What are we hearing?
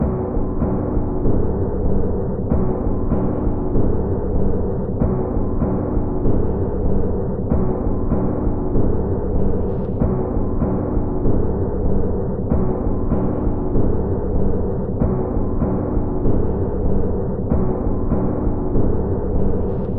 Soundscapes > Synthetic / Artificial
This 96bpm Ambient Loop is good for composing Industrial/Electronic/Ambient songs or using as soundtrack to a sci-fi/suspense/horror indie game or short film.
Industrial, Weird, Underground, Soundtrack, Loop, Drum, Loopable, Ambient, Alien, Dark, Packs, Samples